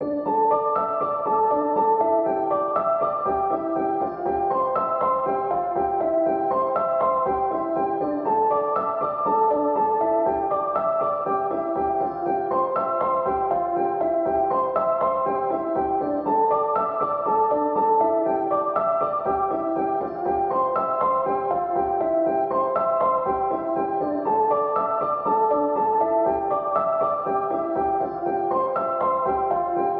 Music > Solo instrument
Piano loops 147 efect 4 octave long loop 120 bpm
120, 120bpm, simplesamples, samples, pianomusic, piano, music, free, reverb, simple, loop